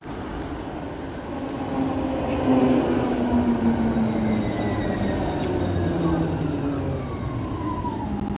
Sound effects > Vehicles
The sound of a tram passing. Recorded in Tampere on iPhone 13 with the Voice Memos app. The purpose of recording was to gather data from vehicles passing by for a binary sound classifier.
field-recording, Tampere, tram